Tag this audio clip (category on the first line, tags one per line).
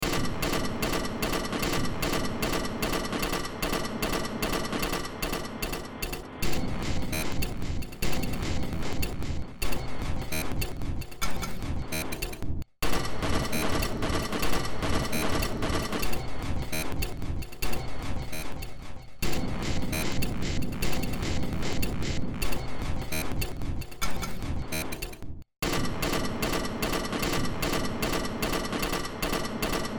Music > Multiple instruments
Noise
Soundtrack
Underground
Ambient
Industrial
Cyberpunk
Sci-fi
Games
Horror